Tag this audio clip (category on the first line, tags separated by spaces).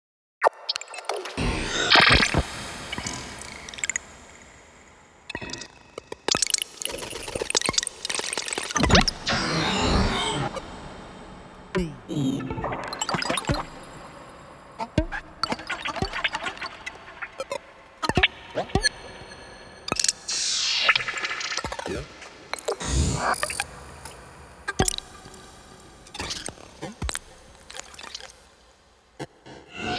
Sound effects > Electronic / Design
fantasy mechanical digital alien electronics robotics sci-fi machine creature electromechanics processed synthetic science-fiction computer fx experimental weird whoosh noise aliens glitchy otherworldly atmosphere sfx monster soundscape drone robot sweep